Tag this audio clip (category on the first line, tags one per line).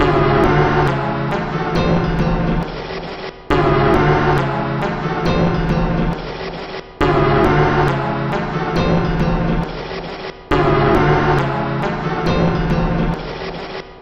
Instrument samples > Percussion
Alien
Packs
Soundtrack